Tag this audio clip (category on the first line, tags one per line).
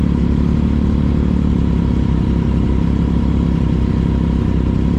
Sound effects > Other mechanisms, engines, machines
Ducati
Motorcycle
Supersport